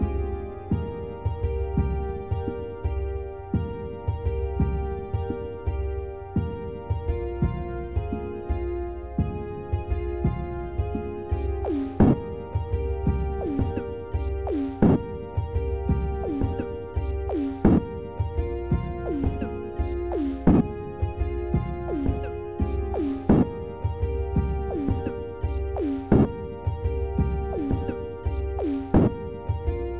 Music > Multiple instruments
townsfolk - music loop
a small loop i made with a roland jv-1080 and some glitchy drums
background, loop, music